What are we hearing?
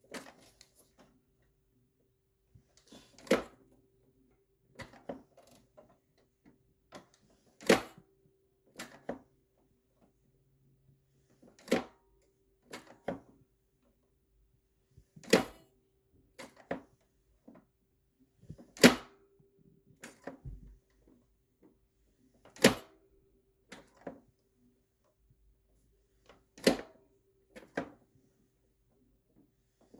Sound effects > Objects / House appliances
DOORAppl-Samsung Galaxy Smartphone Copier, Open, Close Nicholas Judy TDC
A copier door opening and closing.
foley, open, Phone-recording